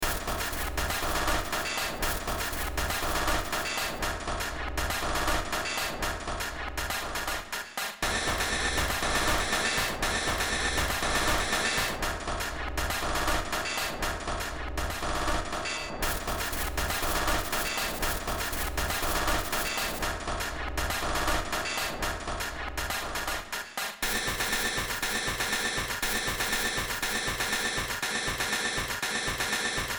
Music > Multiple instruments
Short Track #3865 (Industraumatic)
Industrial, Noise, Games, Soundtrack, Underground, Ambient, Cyberpunk, Sci-fi, Horror